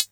Instrument samples > Synths / Electronic
606ModHH OneShot 02
Synth, Drum, HiHat, Vintage, Electronic, DrumMachine, 606, Analog, Bass, music, Mod, Kit, Modified